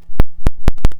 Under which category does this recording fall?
Sound effects > Electronic / Design